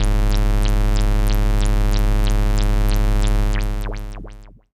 Sound effects > Experimental
Analog Bass, Sweeps, and FX-119
fx, synth, snythesizer, sweep, retro, bassy, weird, electro, trippy, robotic, complex, oneshot, dark, analog, analogue, mechanical, basses, scifi, alien, sci-fi, vintage, electronic, pad, machine, sfx, korg, robot, sample, effect, bass